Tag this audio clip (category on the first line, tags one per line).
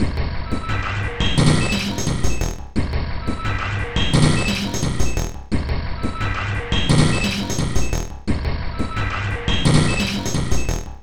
Instrument samples > Percussion
Ambient
Dark
Industrial
Loop
Loopable
Underground
Weird